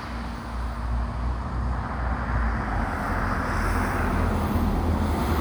Sound effects > Vehicles
Sound of a bus passing by in Hervanta, Tampere. Recorded with a Samsung phone.
vehicle, bus, engine